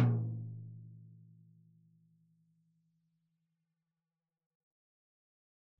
Music > Solo percussion
Med-low Tom - Oneshot 5 12 inch Sonor Force 3007 Maple Rack
drums, kit, flam, realdrum, maple, quality, real, drumkit, tomdrum, oneshot, percussion, toms, beat, Medium-Tom, loop, drum, wood, recording, Tom, med-tom, roll, acoustic, perc